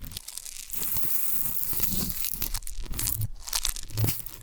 Sound effects > Experimental
Crackle n Squiishh ASMR FX 288
a squishy crackle created with silicone, water, and scrubbies, recorded with close-range sennheiser mic and processed in Reaper
abstract, alien, asmr, crackle, crackling, creature, delicate, effect, freaky, fx, monster, noise, ooze, oozey, sfx, slimey, small, sound, sound-design, squish, squishy, strange, videogame, weird